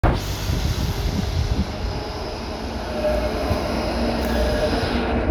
Soundscapes > Urban
A tram passing the recorder in a roundabout. The sound of the tram can be heard. Recorded on a Samsung Galaxy A54 5G. The recording was made during a windy and rainy afternoon in Tampere.
city, passing, tram